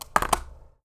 Sound effects > Objects / House appliances
FOLYProp-Blue Snowball Microphone, CU Nintendo Switch Game Case, Set Down on Floor Nicholas Judy TDC
A nintendo switch game case setting down on the table.
Blue-brand, Blue-Snowball, case, floor, foley, game, set-down